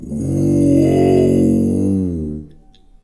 Sound effects > Experimental
Creature Monster Alien Vocal FX-46
Alien, Animal, boss, Creature, Deep, demon, devil, Echo, evil, Fantasy, Frightening, fx, gamedesign, Groan, Growl, gutteral, Monster, Monstrous, Ominous, Otherworldly, Reverberating, scary, sfx, Snarl, Snarling, Sound, Sounddesign, visceral, Vocal, Vox